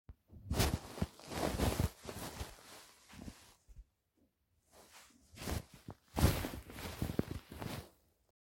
Sound effects > Objects / House appliances
sheets sound
Moving with sheets fabric.
fabric, cloth, pillow